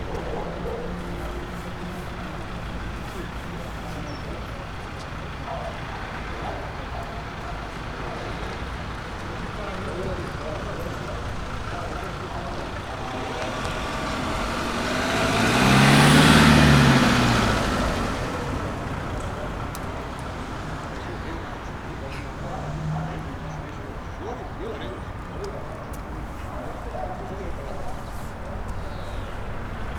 Soundscapes > Urban
20250312 JardinsMontbauInstitut Cars People Birds Annoying
Annoying, Birds, Cars, Institut, Jardins, Montbau, People